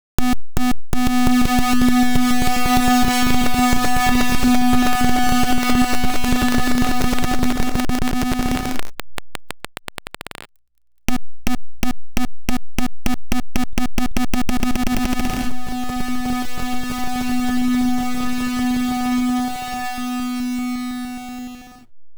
Sound effects > Electronic / Design
Optical Theremin 6 Osc ball delay-001
Analog, Theremin, Theremins, Handmadeelectronic, Sweep, FX, Infiltrator, Digital, noisey, Bass, Robot, Trippy, Optical, Experimental, Glitch, DIY, Sci-fi, Spacey, Glitchy, Otherworldly, SFX, Dub, Noise, Robotic, Electronic, Synth, Instrument, Alien, Electro, Scifi